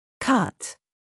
Speech > Solo speech
to chop
english, pronunciation, voice, word